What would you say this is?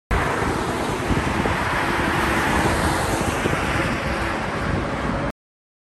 Sound effects > Vehicles
Sun Dec 21 2025 (5)
highway, road